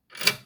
Sound effects > Objects / House appliances
inserting key 4
Here is a sound of me inserting a small key into a small container.
Insert, key, metallic